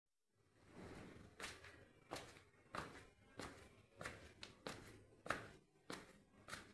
Sound effects > Human sounds and actions
Climbing the stairs
Mobile recording of footsteps on stairs
campus-upf, Stairs, Step